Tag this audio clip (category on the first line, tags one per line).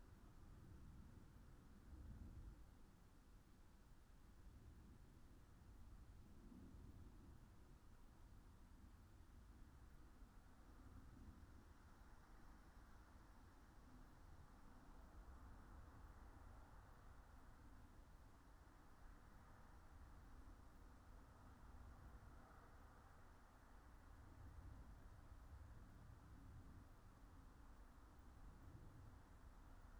Soundscapes > Nature
nature
phenological-recording
raspberry-pi
soundscape